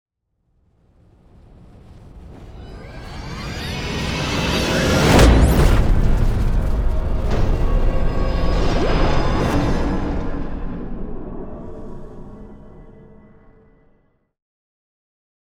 Sound effects > Other
Dark Sound Design Elements SFX PS 010
All samples used in the production of this sound effect were recorded by me. I designed this effect using the ASM Hydrasynth Deluxe and field recordings I made with a Tascam Portacapture x8 recorder and a RØDE NTG5 microphone. Post-production was done in REAPER DAW.
cinematic, dark, effect, epic, game, indent, industrial, metal, movement, reveal, riser, stingersub, sweep, tension, trailer, transition, whoosh